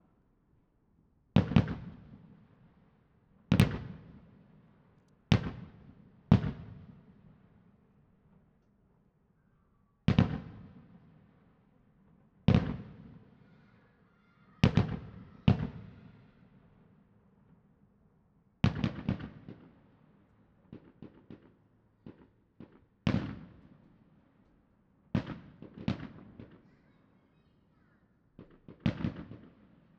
Sound effects > Other
Fireworks Display
Guy Fawkes Night occurs on 5th November in the UK and is a time when bonfires are lit and fireworks set off. Because this was a week day in 2025, most people had their displays at the weekend. This recording includes the sound of fireworks from an organised display that took place on Saturday 8th November, though there will be some others. Recorded with a Zoom H1essential and Earsight standard microphones. There have been no parts cut and removed from the recording - the sequence and gaps here are as occurred on the night.
explosion, explosions, fifth-of-november, fireworks, Guy-Fawkes-Night, Zoom-H1essential